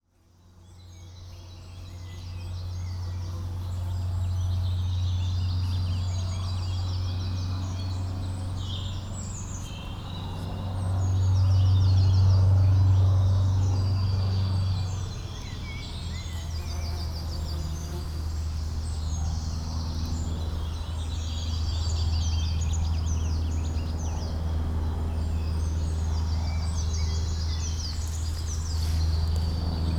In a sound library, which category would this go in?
Soundscapes > Nature